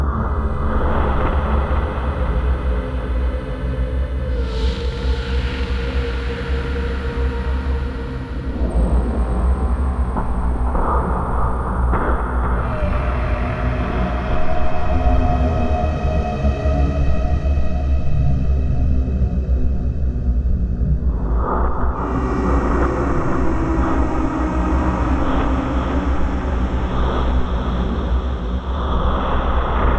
Soundscapes > Synthetic / Artificial
Atmospheric track inspired by The Darkness Hope you enjoy!